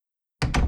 Sound effects > Objects / House appliances
Door Close Free
A brief sound of a door closing.